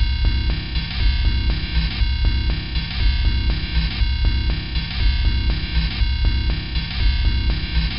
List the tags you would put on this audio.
Instrument samples > Percussion
Alien Ambient Dark Drum Industrial Loop Loopable Packs Samples Soundtrack Underground Weird

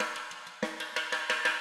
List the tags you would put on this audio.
Music > Solo percussion
processed hits rimshot brass rim fx drums crack drum reverb ludwig realdrums oneshot sfx snaredrum roll hit drumkit snareroll perc snare beat flam realdrum rimshots kit acoustic percussion snares

